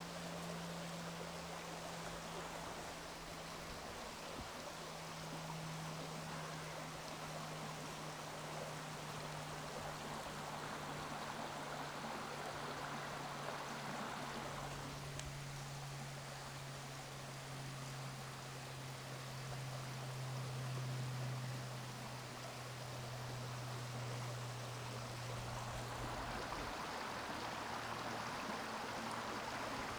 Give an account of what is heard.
Soundscapes > Nature
Distant Waves on Beach
Distant ocean waves on the beach. Recorded on the Isle of Man, Glen Maye Beach. Recorded with Rode NTG5, Tascam DR40.
ocean, sea, beach, waves, coast, shore